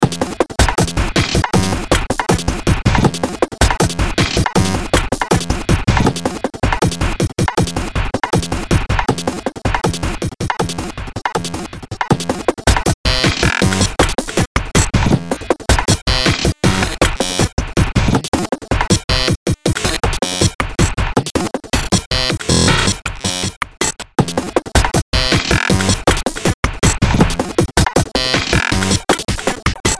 Music > Multiple instruments
Short Track #3691 (Industraumatic)
Industrial, Noise